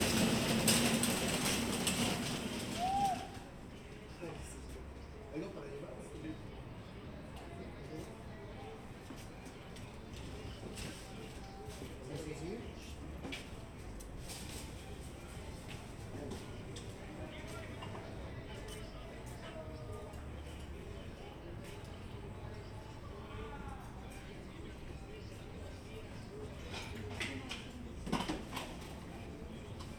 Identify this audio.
Soundscapes > Urban
Ambience Mexico City Indios verdes paradero night
Ambience in Mexico City at Indios verdes subway station 11 pm.
Ambience, Anuncio, CDMX, df, indios, Metro, Mexico, Mexico-City, night, noche, paradero, Perifoneo, verdes